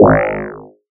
Instrument samples > Synths / Electronic
BWOW 1 Ab
additive-synthesis, bass, fm-synthesis